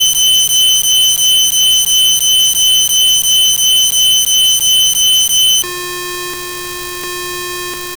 Sound effects > Objects / House appliances
Electromagnetic Field Recording of Christmas Tree LED #001 Short
Electromagnetic field recording of a Christmas Tree LED (Light Emitting Diode) Electromagnetic Field Capture: Electrovision Telephone Pickup Coil AR71814 Audio Recorder: Zoom H1essential
christmas, coil, electric, electrical, electromagnetic, field, field-recording, LED, light, Light-Emitting-Diode, magnetic